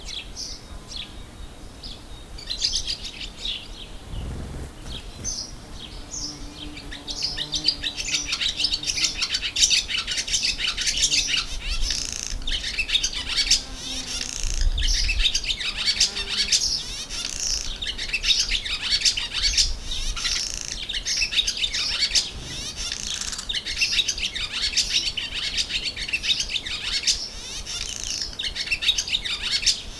Sound effects > Animals
250427-12h53 Interesting bird
Subject : An interesting bird sound. Date YMD : 2025 04 27 12h53 Location : Gergueil France. Hardware : Zoom H5 stock XY capsule. Weather : Processing : Trimmed and Normalized in Audacity.
2025, H5, XY, Village, Spring, Rural, Zoom, Outdoor, Ambience, Gergueil, bird, April